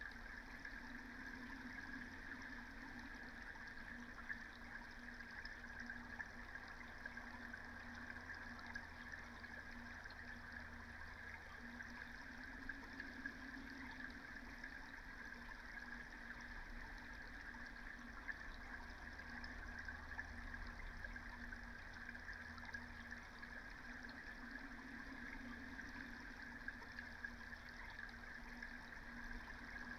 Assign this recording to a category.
Soundscapes > Nature